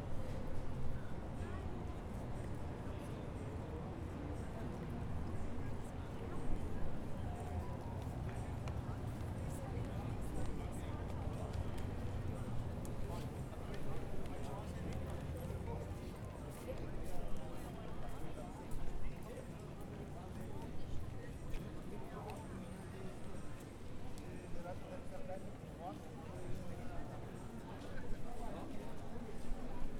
Soundscapes > Urban
Champs-Elysees
Street sounds, avenue des Champs-Élysées in Paris, France, April 10, 2025 at around 6:15 PM. Fifteen minutes, recorded at low level to preserve dynamics, no editing or post-processing. Traffic noises, people walking and talking in many languages, heavy pedestrian traffic, rush hour.